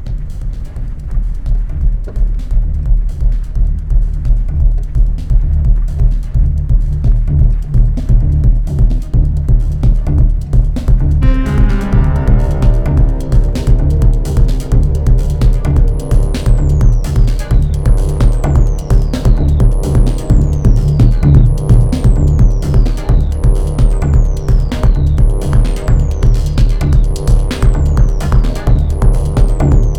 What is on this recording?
Music > Multiple instruments
Dark Warehouse Beat 129bpm
A dark beat and melody evolution created FL Studio and processed with in Reaper
acid, ambient, bass, beat, chill, club, dance, dark, drum, drum-beat, drumbeat, drum-loop, drumloop, dub-step, edm, electro, electronic, hard, house, lo-fi, looming, loop, minimal, rave, techno, trance, warehouse